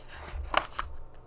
Sound effects > Objects / House appliances
OBJBook-Blue Snowball Microphone Book, Page, Turn 03 Nicholas Judy TDC
Turning a page of a book.